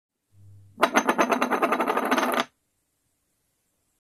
Sound effects > Other
Coin Spin Drop 01
The sound of a commemorative coin doing a slight spin and the wabbling before coming to a stop.
Coin, Fall, Metal, Spin, Wabble